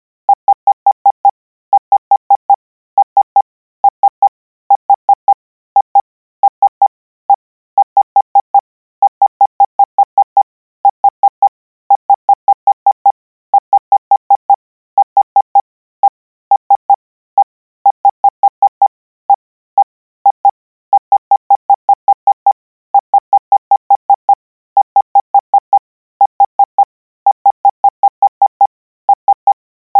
Sound effects > Electronic / Design
Koch 16 E - 200 N 25WPM 800Hz 90%
Practice hear letter 'E' use Koch method (practice each letter, symbol, letter separate than combine), 200 word random length, 25 word/minute, 800 Hz, 90% volume.